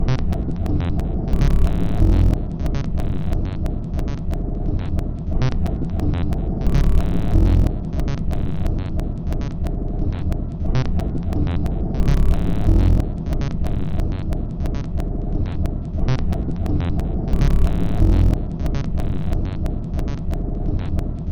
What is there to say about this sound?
Instrument samples > Percussion
This 90bpm Drum Loop is good for composing Industrial/Electronic/Ambient songs or using as soundtrack to a sci-fi/suspense/horror indie game or short film.
Alien, Weird, Dark, Underground, Industrial, Samples, Packs, Loopable, Drum, Loop, Soundtrack, Ambient